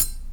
Sound effects > Objects / House appliances
knife and metal beam vibrations clicks dings and sfx-039
Vibration, SFX, metallic, Beam, FX, Klang, Clang, Metal, ding, Perc, ting, Wobble, Trippy, Vibrate, Foley